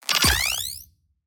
Sound effects > Electronic / Design
Activation sound effect
This sound was recorded, made and processed in DAW using only my samples and synths; - A sharp and distinct sound effect i made while aiming for some kind of activating mechanism or a skill use in videogames. But it can be used in anything really, as long as your imagination is working. - For this one i used synthesized clicks with delay + recorded some stuff over it like scissors. The second half of the sound is some pitch automated and processed synth with tiny reverb on it to give it some realistic space. Each of them are highly processed to make it as hi-tech'y and realistic as possible. B-) - Ы.
activation, click, cyborg, hi-tech, mechanical, military, pitch-rise, robot, robotic, sci-fi, sfx, short, sound-design, switch, turn-on, weapon